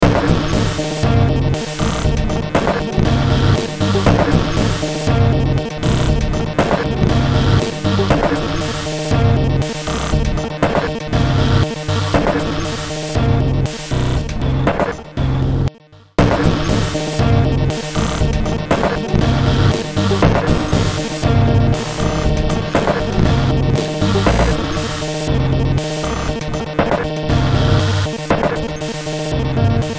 Music > Multiple instruments
Demo Track #3844 (Industraumatic)
Ambient, Cyberpunk, Games, Horror, Industrial, Noise, Sci-fi, Soundtrack, Underground